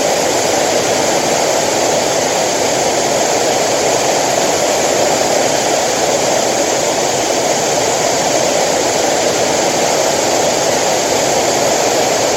Soundscapes > Synthetic / Artificial
COMStatic-Samsung Galaxy Smartphone, CU White Noise Nicholas Judy TDC
A white noise.
noise, Phone-recording, static, white